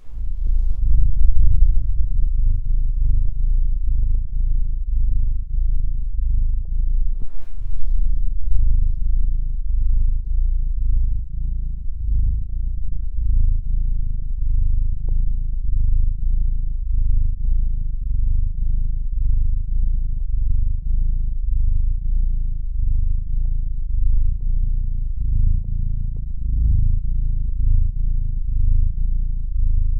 Sound effects > Animals
Subject : Resting my ear against the stomach of Pearl the cat as she's lying on her side. Date YMD : 2025 August 11th 19h50 Location : Albi 81000 Tarn Occitanie France. Single side of a Soundman OKM I binaural microphone. Weather : Hot... Indoors. Processing : Trimmed and normalised in Audacity.